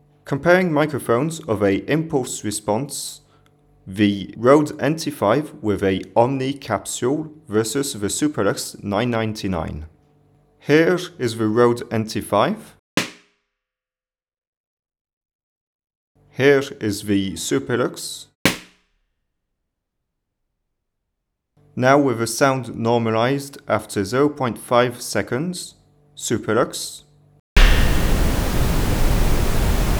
Sound effects > Other
I just got some Rode NT5-o capsules to record impulse reponses. Here I'm comparing the NT5-O to my previous omni microphone, the Superlux ECM-999 measurement microphone. I had found the Superlux to be too noisy, to adequately hear the reverb of a place until the end. I'm exaggerating there to normalise the sounds after 0.5s, but you can hear the NT5 can clearly recover some reverb while the Supelux is lost in noise. Gear Tascam FR-AV2 Rode NT5 with NT45-o capsule, superlux ecm-999. Both had a foam wind-cover on. Balloon popped 1m under the microphones. Commentary on a Mac book air m2 microphone. Indoors in a 490cm L x 300 W x 270cm H room.